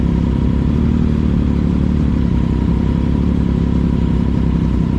Sound effects > Other mechanisms, engines, machines
clip prätkä (6)
Description (Motorcycle) "Motorcycle Idling: distinctive clicking of desmodromic valves, moving pistons, rhythmic thumping exhaust. High-detail engine textures recorded from close proximity. Captured with a GoPro Hero 4 on the track at Alastaro.The motorcycle recorded was a Ducati Supersport 2019."
Ducati, Motorcycle, Supersport